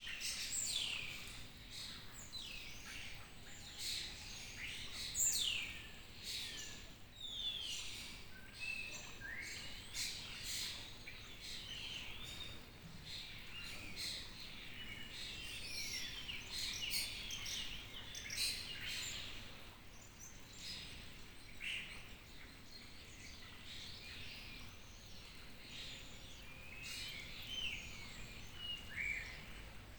Soundscapes > Urban
Recorded with an Oppo A9 mobile phone between 7:20 and 7:30 a.m. in Piazza Margherita in Castelbuono (PA) on 21 March 2025. The chirping of swallows can be heard. The cries of blackbirds, pigeons and other birds. A few cars pass through the square. The chatter of passers-by can be heard in the distance. In the centre of the square is a fountain, its gushing can be heard.